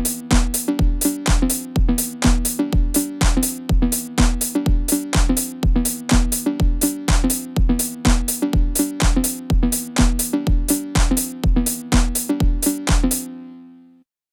Music > Multiple instruments
Simple unquantized badly rendered audio file
Made this with a pocket knife and a spoon, rendered in FL11 tho.
wtf, lol, acid, electro, 808, loop, 909, house, roland